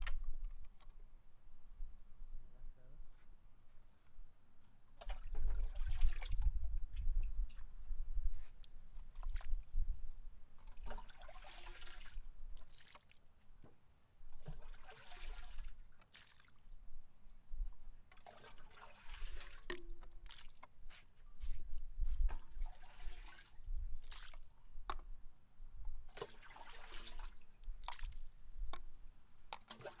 Soundscapes > Nature
Water Sounds, Koh Rong, Cambodia (May 7, 2019)
Field recording of gentle water sounds on the island of Koh Rong, Cambodia. Calm and peaceful ambiance.
peaceful, Rong, nature, Koh, ambient, calm, water, Cambodia